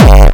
Instrument samples > Percussion
Gabbar Kick 1
Sample used from FLstudio original sample pack only. Plugin used: ZL EQ, Waveshaper.
Gabbar, Hardstyle, kick, Oldschool